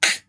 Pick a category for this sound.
Speech > Other